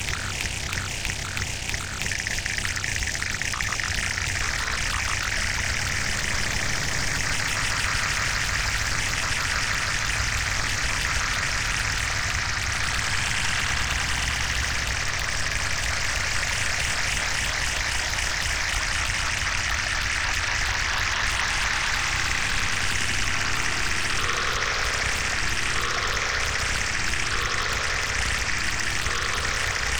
Soundscapes > Other
Synthed with 3xOsc only. A beat loop from Bandlab as the carrier of the vocodex. Processed with OTT, ZL EQ, Fracture